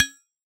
Sound effects > Objects / House appliances
Empty coffee thermos-009
percusive, sampling, recording